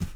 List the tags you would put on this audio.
Sound effects > Objects / House appliances
water liquid fill cleaning pail lid kitchen household hollow knock bucket object scoop slam handle clatter shake tip debris plastic spill foley garden tool metal clang carry pour drop container

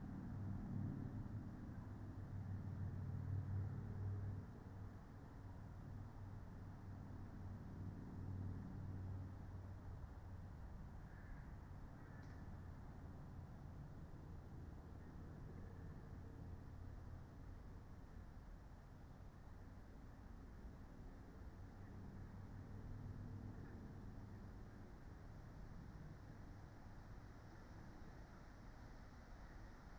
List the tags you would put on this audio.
Soundscapes > Nature
Dendrophone modified-soundscape natural-soundscape soundscape

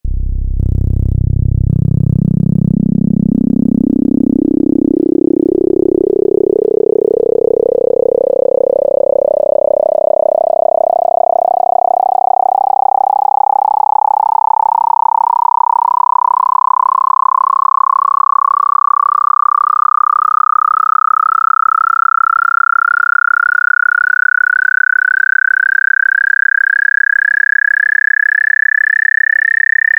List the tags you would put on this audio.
Instrument samples > Synths / Electronic
Montage MODX FM-X Yamaha

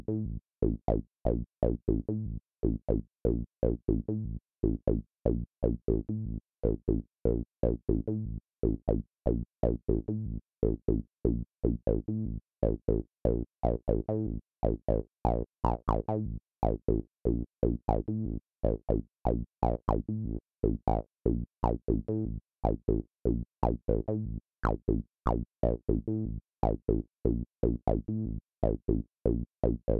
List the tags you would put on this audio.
Music > Solo instrument
TB-03 Acid house 303 Roland techno hardware synth Recording electronic

